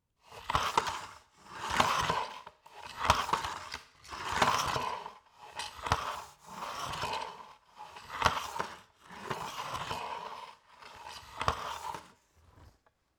Sound effects > Objects / House appliances
250726 - Vacuum cleaner - Philips PowerPro 7000 series - head on floor (silent vaccuming) (head brush up)
7000, aspirateur, cleaner, FR-AV2, Hypercardioid, MKE-600, MKE600, Powerpro, Powerpro-7000-series, Sennheiser, Shotgun-mic, Shotgun-microphone, Single-mic-mono, Tascam, Vacum, vacuum, vacuum-cleaner